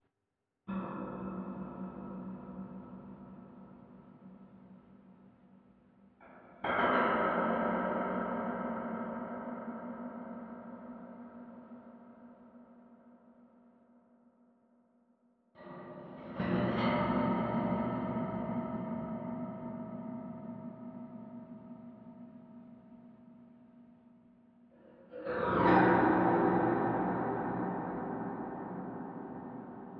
Sound effects > Other mechanisms, engines, machines
large olde fan ekos
I snuck into this basement to make some beats, and there's this huge industrial fan sitting there. It has a really sweet natural reverb even when you just touch it. So I was plucking the spokes like a harp to get these deep drops. Ҳаҳәоит, агәахәара шәзаԥҵаны иаԥҵа
Cave, Scifi, Atmosphere, Dark, Bang, Cavern, Haunted, Creepy, Echo, Metal, Deep, Drops, Cinematic, Impact, Alien, Reverb